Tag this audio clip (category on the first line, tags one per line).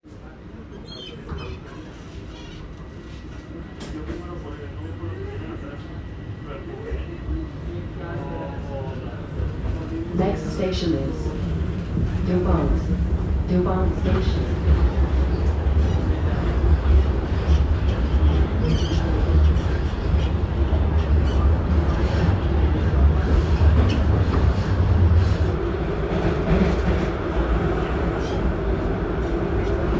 Soundscapes > Urban
ambience
transit
subway
toronto
ttc